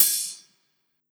Instrument samples > Percussion
Hyperrealism V9 HiHat open
cymbals, digital, drum, drums, Hihat, machine, one-shot, sample, stereo